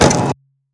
Sound effects > Other mechanisms, engines, machines
Belt Click Clap
Samples recorded during my time as a cashier summer 2017 newly mixed and mastered for all your audio needs. This is my recording of a conveyor belt clicking as it cycles through at the cash register of a grocery store with a smart phone voice memo application.
store, machine, conveyor, checkout, grocery, grocerystore, cashier, belt